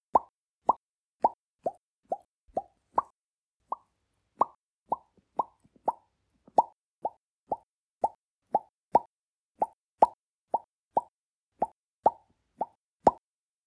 Sound effects > Human sounds and actions
Fish - ASMR Blup Blup
A mouth made fish sound in ASMR style. * No background noise. * No reverb nor echo. * Clean sound, close range. Recorded with Iphone or Thomann micro t.bone SC 420.